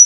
Instrument samples > Percussion
5881 Hz longtrig
USE IT AT A VERY VERY LOW VOLUME!!! A 5881 ㎐ click used as a kick (attack) trigger in death metal. If you overdo it it sounds LIKE SHIT, AND I HATE IT!!!